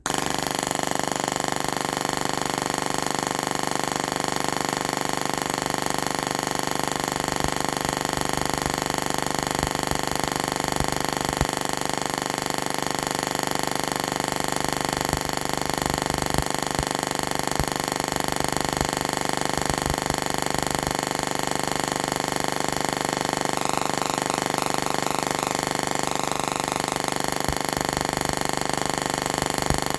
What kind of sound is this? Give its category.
Sound effects > Electronic / Design